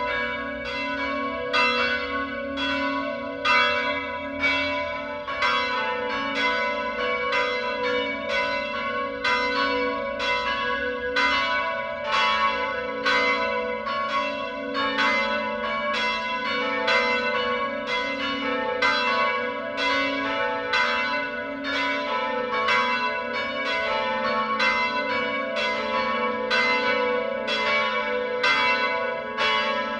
Soundscapes > Other
AMB-ChurchBell-SœursDeLaProvidence,France-03Aug2025,0918H
Sound of a bell in Sours de la Providence cathedral in Ruille Sur Loir, France. Recorded with iPhone 14 internal microphone.
AMB, Bell, Church, France, SFX